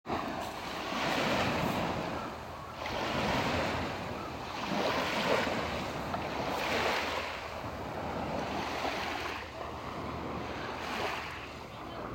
Soundscapes > Nature
37 Pearl Island Rd
small rocky beach sounds in the San Juan islands WA. I recorded this on my iphone 14, voice memo
waves, sea, small